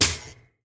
Sound effects > Objects / House appliances
MACHAppl-Samsung Galaxy Smartphone, CU Toaster, Pop Up Nicholas Judy TDC
A toaster popping up.
cartoon, foley, Phone-recording, pop-up